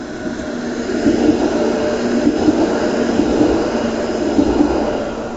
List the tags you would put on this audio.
Soundscapes > Urban
city
field-recording
outside
street
traffic
tram
trolley
urban

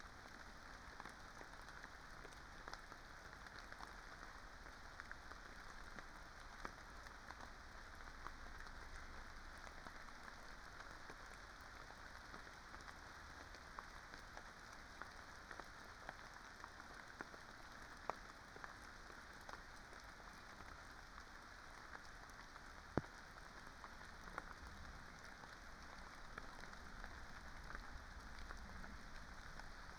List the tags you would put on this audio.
Soundscapes > Nature
field-recording
raspberry-pi

